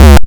Instrument samples > Synths / Electronic
DJ ENZN Kick 04
Just a dariacore kick.
bass-drum, bassdrum, drum, hit, kick, kick-drum, one-shot